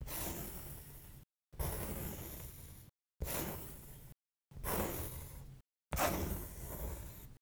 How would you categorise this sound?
Sound effects > Objects / House appliances